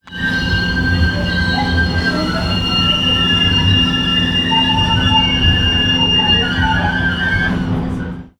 Soundscapes > Urban
The sound of an MBTA Green Line train in downtown Boston screeching as it rounds a corner.
MBTA, subway